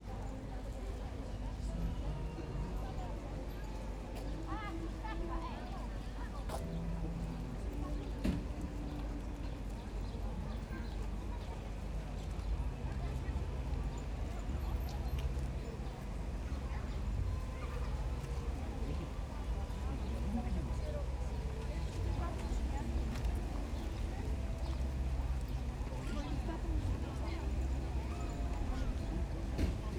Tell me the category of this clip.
Soundscapes > Urban